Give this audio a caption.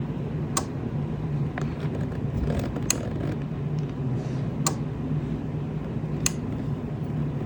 Sound effects > Objects / House appliances
simple lightswitch. my own sound. shot on an iphone